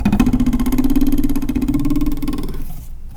Sound effects > Objects / House appliances
knife and metal beam vibrations clicks dings and sfx-044
Beam, Clang, ding, Foley, FX, Klang, Metal, metallic, Perc, SFX, ting, Trippy, Vibrate, Vibration, Wobble